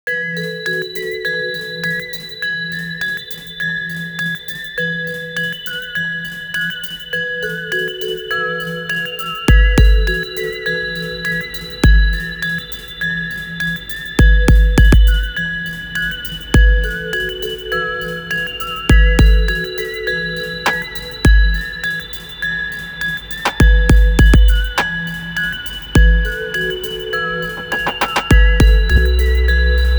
Music > Multiple instruments
Immaculate Ambient Beat Loop with bass and trill melody 102pm
a chill and trippy key loop, kind of alien sounding, a lil trip hop sounding, made with fl studio and a few secret sauce vsts, processed in reaper
key, keys, alien, ambient, lead, electronic, edm, loop, beat, synthy, synth, weird, toploop, loopable, melodyloop, triphop, electro, pretty, chill, hiphop, keyloop, ambiant, melody, synthloop